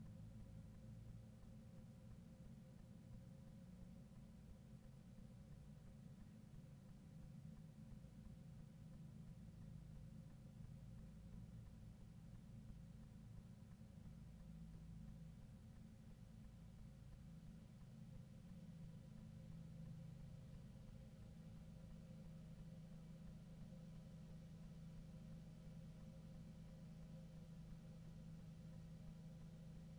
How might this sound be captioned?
Sound effects > Vehicles
20250612-00h25 Albi Mosquito spray van - H2n XY letterbox
Subject : A truck spraying pyrethroid in the street due to someone having had the dengue fever in the area by an asian tiger mosquito. Recorded from the inside of a letterbox. Date YMD : 2025 June night if 11-12 starting 00:25 (truck passes in front near 00:57) Location : Albi 81000 Tarn Occitanie France. Hardware : Zoom H2n XY mode. Weather : Clear sky, no wind. Processing : Trimmed and normalised in Audacity. Notes : There’s a parallel recording using a Zoom starting/finishing exact same time.
2025, Altopictus, field-recording, night, pyrethroid, spray, spraying, street, Tarn, truck, XY, Zoom-brand